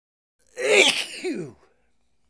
Sound effects > Human sounds and actions
Bad head cold. I was doing several dozen per hour.
sneeze, sick, head-cold, sneezing